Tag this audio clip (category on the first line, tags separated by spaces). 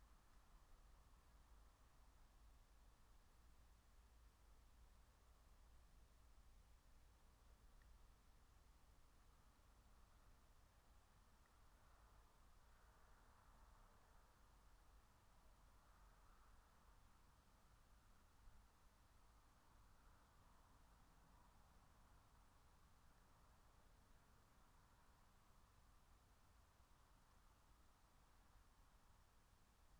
Soundscapes > Nature
phenological-recording meadow natural-soundscape raspberry-pi nature soundscape alice-holt-forest